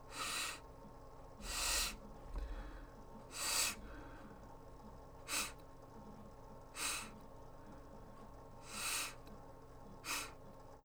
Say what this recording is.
Sound effects > Human sounds and actions
HMNSniff-Blue Snowball Microphone, MCU Sniffs Nicholas Judy TDC
Blue-brand, Blue-Snowball, human, nose, sniff